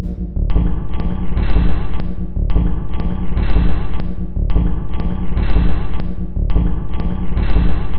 Instrument samples > Percussion
This 120bpm Drum Loop is good for composing Industrial/Electronic/Ambient songs or using as soundtrack to a sci-fi/suspense/horror indie game or short film.
Drum, Loopable, Loop, Underground, Samples, Ambient, Weird